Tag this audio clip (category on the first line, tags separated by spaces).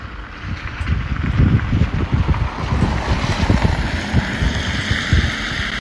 Soundscapes > Urban
traffic vehicle